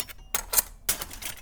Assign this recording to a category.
Sound effects > Other mechanisms, engines, machines